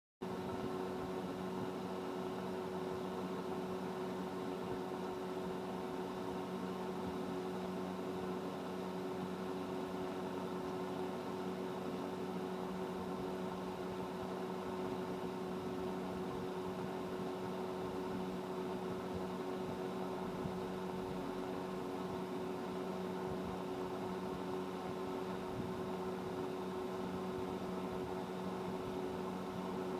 Soundscapes > Indoors
A recording of a fan heater under a desk in an office.
Air-conditioner, Appliance, compressor, Fan, Heater, Office